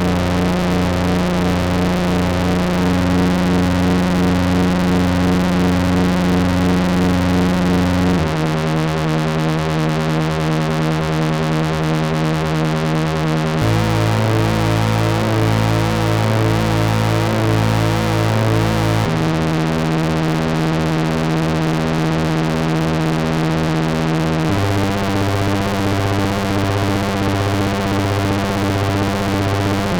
Soundscapes > Synthetic / Artificial
Space Drone 002
Drone sound 002 Developed using Digitakt 2 and FM synthesis
artificial, drone, FM, soundscape, space